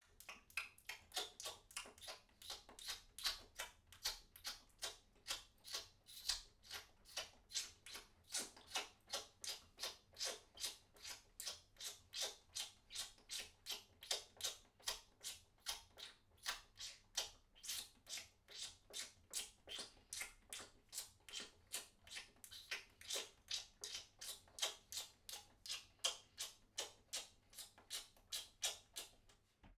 Sound effects > Human sounds and actions
Alien - Cheer 12 (Mouth sounds)
Rode, FR-AV2, individual, applause, Tascam, solo-crowd, weird, XY, experimental, person, indoor, single, alternate, NT5, Alien, original